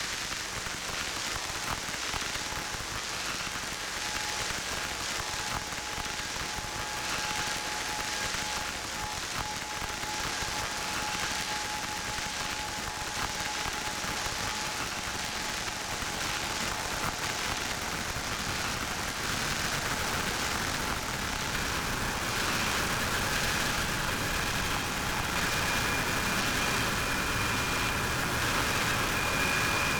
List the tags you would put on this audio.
Soundscapes > Synthetic / Artificial
alien ambience ambient atmosphere bass bassy dark drone effect evolving experimental fx glitch glitchy howl landscape long low roar rumble sfx shifting shimmer shimmering slow synthetic texture wind

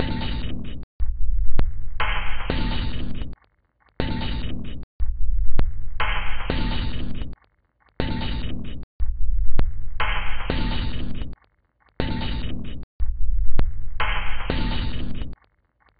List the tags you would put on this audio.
Instrument samples > Percussion
Alien Ambient Drum Industrial Loop Loopable Packs Samples Soundtrack Underground Weird

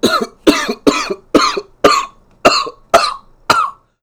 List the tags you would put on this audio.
Sound effects > Human sounds and actions
Blue-brand Blue-Snowball cough human male

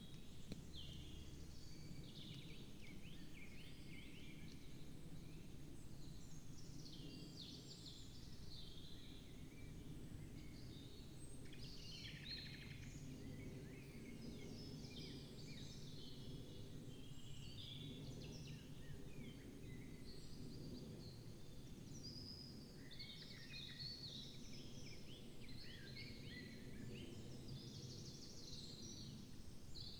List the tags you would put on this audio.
Soundscapes > Nature
weather-data raspberry-pi phenological-recording alice-holt-forest artistic-intervention field-recording soundscape data-to-sound modified-soundscape natural-soundscape sound-installation Dendrophone nature

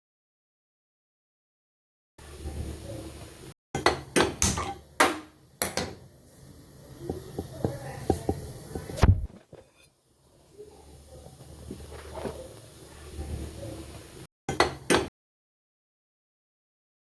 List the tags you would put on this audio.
Sound effects > Objects / House appliances
gas Indian Kitchen lighter stove

